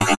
Sound effects > Electronic / Design
RGS-Glitch One Shot 27
Noise, Glitch, FX, Effect, One-shot